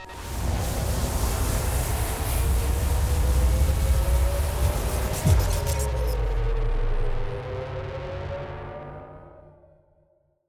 Sound effects > Electronic / Design
Sci-Fi SpaceShip
A sci fi SpaceShip made with pigments and processed through various GRM plugins, at first I had a vision of a spaceship turning on and launching like a rocket to the sky, I believe that this is a great base for starting sweetening and developing it further
Booster, Engine, Futuristic, synthetic, sci-fi, science-fiction, SpaceShip